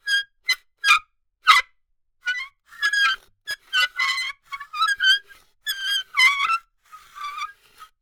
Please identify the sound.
Sound effects > Objects / House appliances
Soap tray sliding (annoying sound) 2
Subject : Sliding a plastic soap tray against the bathroom sink, to make this stringent/strident sound. Date YMD : 2025 04 22 Location : Gergueil France. Hardware : Tascam FR-AV2, Rode NT5 Weather : Processing : Trimmed and Normalized in Audacity.
NT5, soap-tray